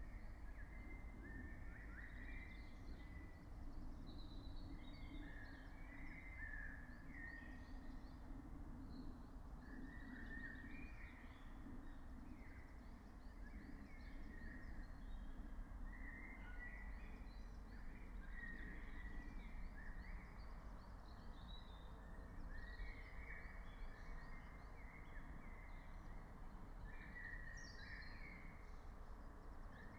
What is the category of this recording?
Soundscapes > Nature